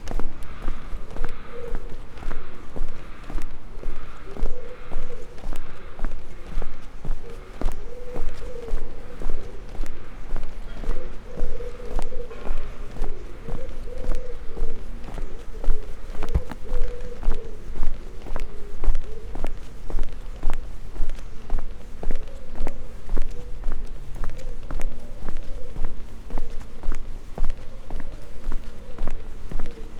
Sound effects > Human sounds and actions
250607 06h05 Albi Rue Mariès - Walking to the Cathedral
Subject : Walking towards the cathedral from Rue Mariès in Albi. Date YMD : 2025 06 07 (Saturday). Early morning. Time = 06h05 Location : Albi 81000 Tarn Occitanie France. Hardware : Tascam FR-AV2, Rode NT5 with WS8 windshield. Had a pouch with the recorder, cables up my sleeve and mic in hand. Weather : Grey sky. Little to no wind, comfy temperature. Processing : Trimmed in Audacity. Other edits like filter, denoise etc… In the sound’s metadata. Notes : An early morning sound exploration trip. I heard a traffic light button a few days earlier and wanted to record it in a calmer environment.
81000, Albi, City, Early-morning, FR-AV2, hand-held, handheld, morning, Occitanie, Rode, Saturday, Single-mic-mono, Tarn, Tascam, urbain, walk